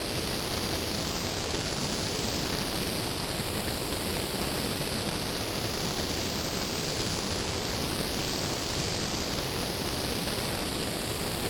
Soundscapes > Synthetic / Artificial
10 - Atmospheres & Ambiences - Far Harbour
Heavy noise-based rain with some funky fx.
ambience, ambient, beach, electronic, monsoon, ocean, rain, rainfall, sea, soundscape